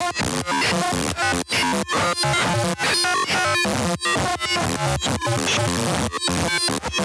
Sound effects > Experimental
Glitchy audio
Warning: may be loud or annoying to some people; This sound was made and processed in DAW; Using some magic and obviously my skills i was able to create super randomly generated mess that i connected to some stuff and BOOM - glitchy thingy. Sounds like something super intense like running from a monster while world is falling apart or maybe and actual monster-glitch running around the house.. I don't know! Use it for anything you want and also, Ы.